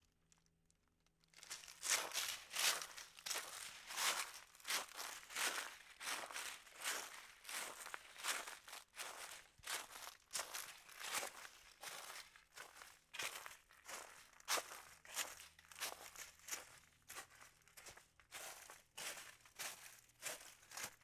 Sound effects > Human sounds and actions
Footsteps through Leaves Steady Pace
Footsteps through leaves at a slow steady pace. Recorded with a ZOOM H6 and a Sennheiser MKE 600 Shotgun Microphone. Go Create!!!